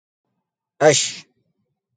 Sound effects > Other
shin-sisme
arabic, male, sound, vocal, voice